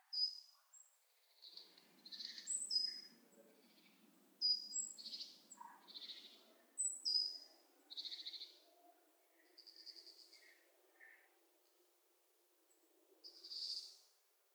Sound effects > Animals
forest birds 1
Isolated bird calls in forest. Location: Poland Time: November 2025 Recorder: Zoom H6 - SGH-6 Shotgun Mic Capsule
autumn, birds, birdsong, isolated